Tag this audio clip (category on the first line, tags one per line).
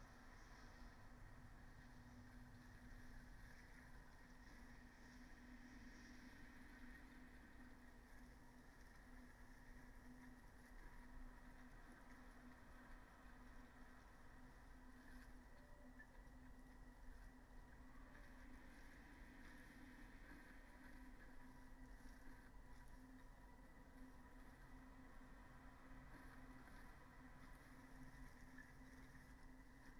Soundscapes > Nature
alice-holt-forest
phenological-recording
sound-installation
weather-data
nature
raspberry-pi
data-to-sound
artistic-intervention
field-recording
modified-soundscape